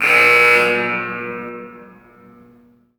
Sound effects > Other mechanisms, engines, machines
A machine warning sound, specifically a heavy duty bridge crane. Or is it medium duty?